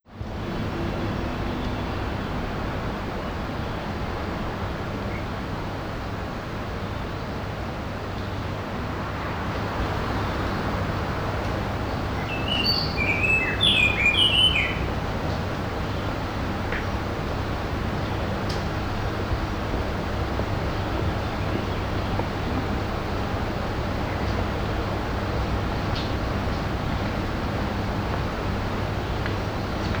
Soundscapes > Nature
040 BOTANICO AMBIENT BIRDS CITY 1
birds, ambient, city